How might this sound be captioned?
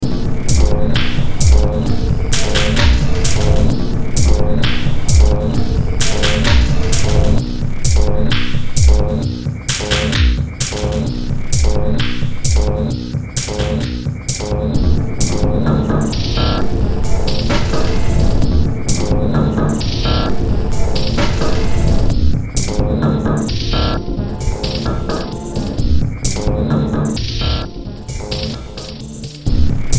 Music > Multiple instruments
Short Track #3569 (Industraumatic)
Ambient, Cyberpunk, Games, Horror, Industrial, Noise, Sci-fi, Soundtrack, Underground